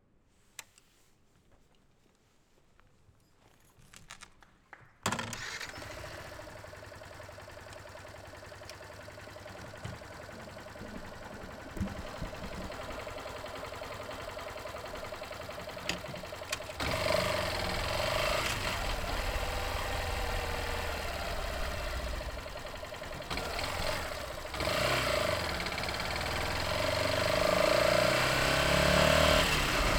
Sound effects > Other mechanisms, engines, machines
MOTO FACTOR 2
Motorcycle Sounds recorded with rode wireless go, mic sticked on to the motorcycle.
150cc, engine, moto, motorcycle, ride, yamaha